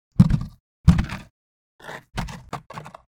Sound effects > Objects / House appliances
Enhanced: 590125 Plastic Chair Impact Bumping Moving noises
Camp-chair, Chair, drop, fall, fall-over, hit, impact, thud